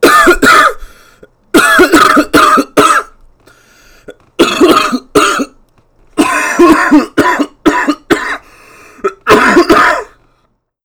Sound effects > Human sounds and actions
HMNCough-Blue Snowball Microphone, CU Heavy Nicholas Judy TDC
Blue-Snowball, heavy, Blue-brand, male, human, cough